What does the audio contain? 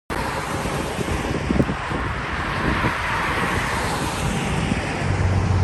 Sound effects > Vehicles
Car passing by in highway
car, highway